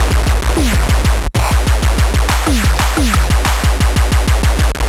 Music > Solo percussion
Sounds made with The Bleep Drum, an Arduino based lo-fi rad-fi drum machine
Drum, Snare, Clap, Bleep, Lo-Fi, Loop